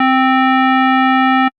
Instrument samples > Synths / Electronic
05. FM-X ODD2 SKIRT4 C3root
FM-X, MODX, Montage, Yamaha